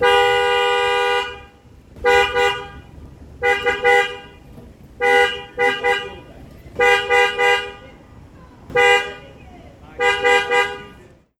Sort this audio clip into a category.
Sound effects > Vehicles